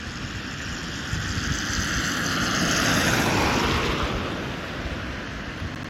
Soundscapes > Urban
auto1 copy
vehicle, car